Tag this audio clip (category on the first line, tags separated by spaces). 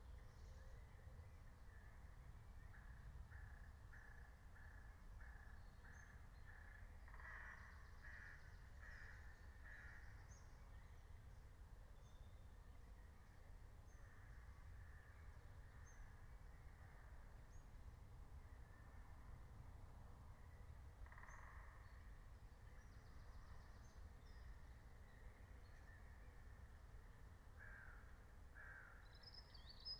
Soundscapes > Nature
alice-holt-forest field-recording meadow natural-soundscape nature phenological-recording raspberry-pi soundscape